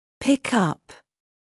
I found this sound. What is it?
Speech > Solo speech
pick up
english, pronunciation, voice, word